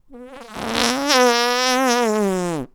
Sound effects > Human sounds and actions
Imitation of a fart done by my kid who is blowing air on his arm :)